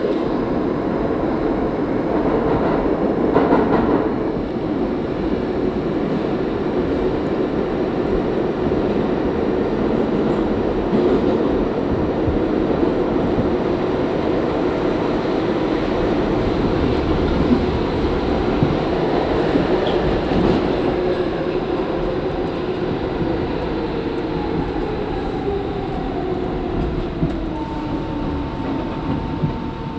Soundscapes > Urban
Subway Ride in NYC
New York City subway empty car background sounds.
nyc, Subway, subwaycar